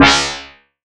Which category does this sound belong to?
Instrument samples > Synths / Electronic